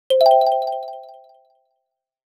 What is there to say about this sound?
Sound effects > Electronic / Design
GAME UI SFX PRACTICE 3
Program : FL Studio, Purity